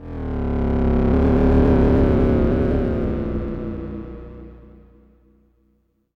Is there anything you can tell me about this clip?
Sound effects > Experimental
Analog Bass, Sweeps, and FX-094
sweep, analogue, synth, robotic, scifi, bassy, analog, snythesizer, effect, retro, electronic